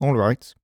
Speech > Solo speech
Date YMD : 2025 July 29 Location : Indoors France. Inside a "DIY sound booth" which is just a blanket fort with blankets and micstands. Sennheiser MKE600 P48, no HPF. A pop filter. Speaking roughly 3cm to the tip of the microphone. Weather : Processing : Trimmed and normalised in Audacity.
2025, Adult, Alright, Calm, FR-AV2, Generic-lines, Hypercardioid, july, Male, mid-20s, MKE-600, MKE600, Sennheiser, Shotgun-mic, Shotgun-microphone, Single-mic-mono, Tascam, VA, Voice-acting